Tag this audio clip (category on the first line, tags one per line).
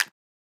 Sound effects > Objects / House appliances
clap
matchstick
matchstick-box
shaking